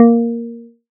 Instrument samples > Synths / Electronic
APLUCK 1 Bb
additive-synthesis, fm-synthesis, pluck